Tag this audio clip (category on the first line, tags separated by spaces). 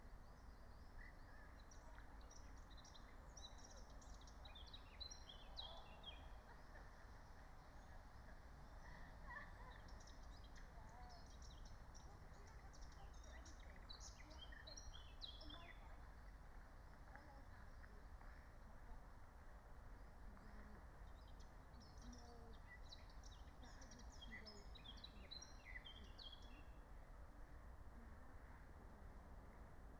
Soundscapes > Nature
phenological-recording nature meadow natural-soundscape raspberry-pi field-recording alice-holt-forest soundscape